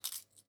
Sound effects > Other
Quick vegetable crunch
Sound of a potato being snapped by hand
Crunch, Organic, Quick, Vegetable, Wet